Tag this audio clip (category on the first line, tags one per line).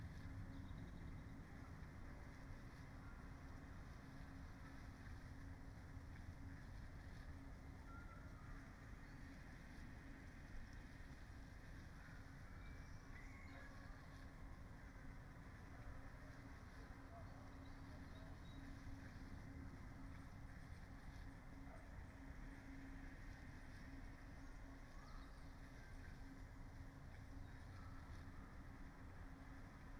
Soundscapes > Nature
data-to-sound
modified-soundscape
sound-installation
alice-holt-forest
Dendrophone
raspberry-pi
weather-data
field-recording
soundscape
artistic-intervention
nature
phenological-recording
natural-soundscape